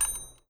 Sound effects > Objects / House appliances
BELLHand-Blue Snowball Microphone, CU Timer Ding Nicholas Judy TDC
A timer ding.
bell, Blue-brand, Blue-Snowball, ding, timer